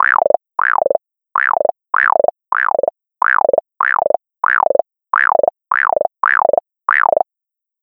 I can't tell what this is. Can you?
Sound effects > Animals
The Sample sounds like a frog and it was created with audacity,using a sawtooth wave and several effects like resonance and vibrato.